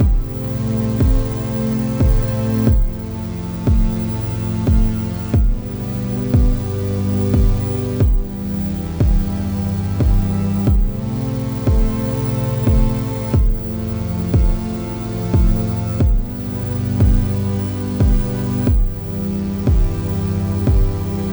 Music > Multiple instruments
Cosmic Game Loop - Space
I’ll be happy to adjust them for you whenever I have time!
90bpm, cosmic, game, loop, seamless, soundtrack